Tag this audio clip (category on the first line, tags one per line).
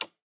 Sound effects > Human sounds and actions
toggle
switch
button
interface
activation
click
off